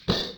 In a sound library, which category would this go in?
Sound effects > Human sounds and actions